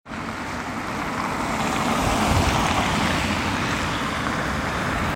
Sound effects > Vehicles
Recording of a car near a roundabout in Hervanta, Tampere, Finland. Recorded with an iPhone 14
automobile, outside, vehicle, car